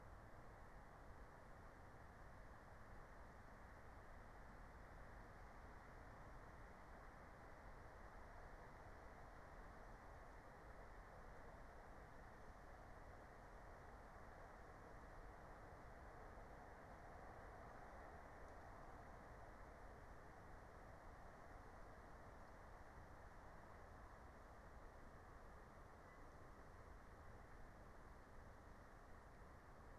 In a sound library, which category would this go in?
Soundscapes > Nature